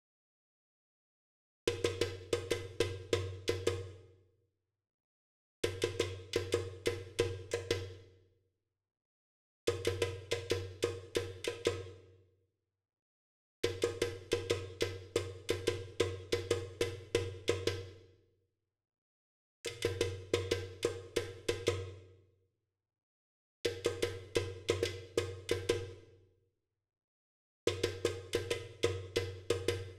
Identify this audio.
Music > Solo percussion
Djembe hits 120 bpm
hits, percussion